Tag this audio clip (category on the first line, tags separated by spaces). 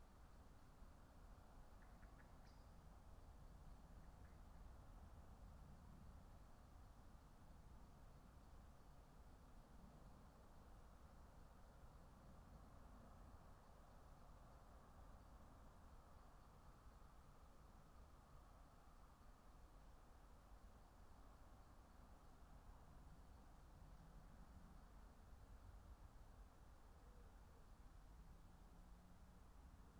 Soundscapes > Nature
data-to-sound Dendrophone sound-installation